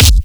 Instrument samples > Percussion
BrazilFunk Kick 16 Processed-7.5
BrazilianFunk, BrazilFunk, Brazilian, Distorted